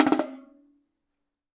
Music > Solo instrument
Vintage Custom 14 inch Hi Hat-010
Custom, Cymbal, Cymbals, Drum, Drums, Hat, Hats, HiHat, Kit, Metal, Oneshot, Perc, Percussion, Vintage